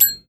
Sound effects > Objects / House appliances
BELLHand-Samsung Galaxy Smartphone, CU Desk Bell, Ring, Muted Nicholas Judy TDC
A muted desk bell ring. Recorded at Mario's Italian Restaurant.
bell, desk, muted, Phone-recording, ring